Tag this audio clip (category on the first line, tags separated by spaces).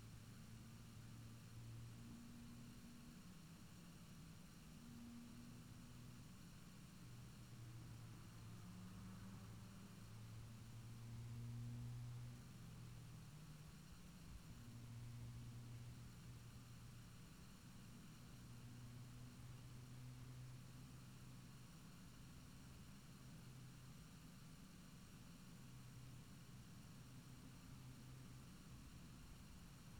Soundscapes > Nature
alice-holt-forest field-recording meadow natural-soundscape nature phenological-recording raspberry-pi soundscape